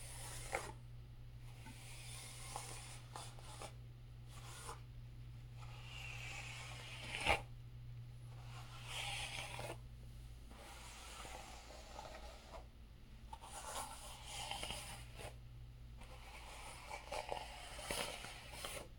Sound effects > Human sounds and actions
Brushing Hair
Brushing through tangled hair recorded on my phone microphone the OnePlus 12R
hair, brushing, tangle, brush, brushing-hair